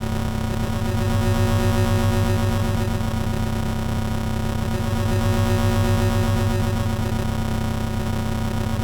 Sound effects > Other mechanisms, engines, machines
IDM Atmosphare9( C note )
嗨 ！那不是录制声音:) 我用phasephant合成它！
IDM, Industry, machinery, Noise, working